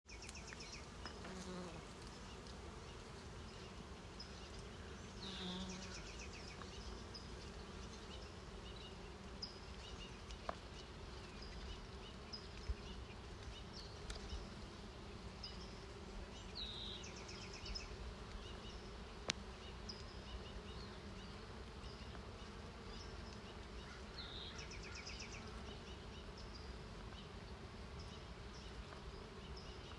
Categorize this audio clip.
Soundscapes > Nature